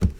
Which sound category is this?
Sound effects > Objects / House appliances